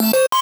Sound effects > Electronic / Design
UI SFX created using Vital VST.
notifications, options, digital, button, messages, menu, alert, UI, interface